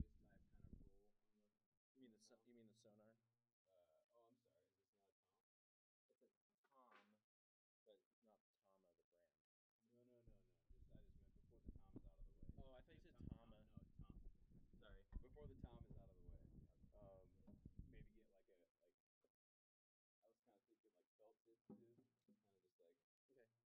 Music > Solo percussion
Med-low Tom - Oneshot 21 12 inch Sonor Force 3007 Maple Rack
acoustic, beat, flam, Medium-Tom, perc, percussion, quality, real, realdrum, recording, Tom, tomdrum